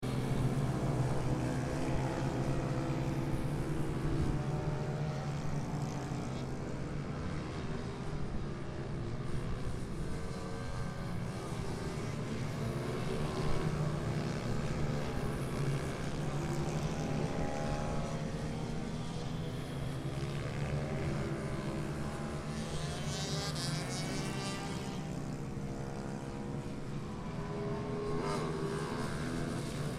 Soundscapes > Other
Supermoto Polish Championship - May 2025 - vol.3 - Racing Circuit "Slomczyn"
Recorded on TASCAM - DR-05X; Field recording on the Slomczyn racetrack near Warsaw, PL; Supermoto Championship;
bike, motorbikes, supermoto, warszawa, tor, moto, championship, smolczyn, motorcycles, racetrack